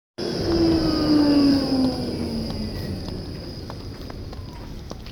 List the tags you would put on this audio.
Soundscapes > Urban
recording
Tampere
tram

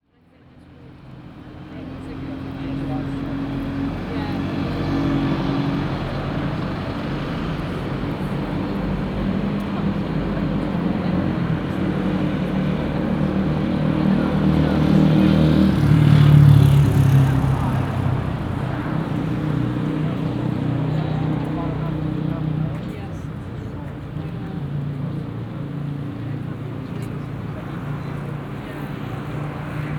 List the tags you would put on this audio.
Soundscapes > Urban
Cardiff
City
Citycentre
fieldrecording
urban

